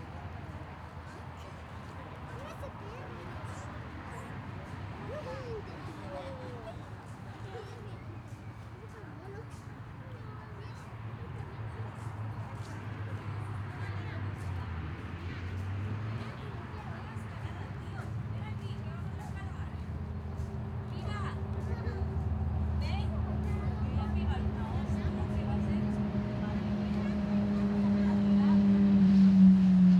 Soundscapes > Urban
Almazora Park
Children playing in a park in Almazora, Castellòn in the afternoon surrounded by nature.